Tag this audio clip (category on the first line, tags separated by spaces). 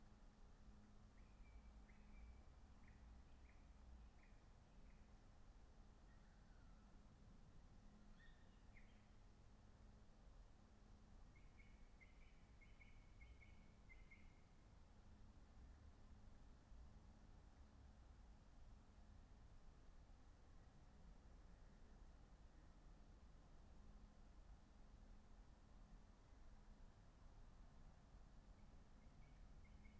Soundscapes > Nature
modified-soundscape artistic-intervention sound-installation nature data-to-sound Dendrophone phenological-recording natural-soundscape weather-data soundscape alice-holt-forest field-recording raspberry-pi